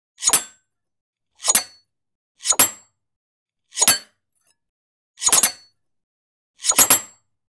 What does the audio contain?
Sound effects > Objects / House appliances
custom knife or bladed projectile thrown lodged on hard surface. inspired by lancer vs true assassin from heavens feel.